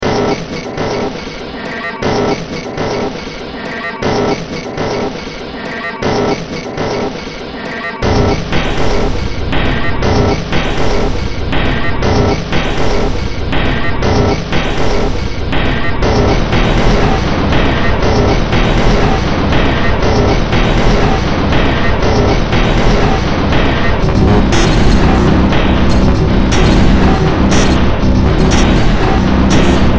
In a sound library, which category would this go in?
Music > Multiple instruments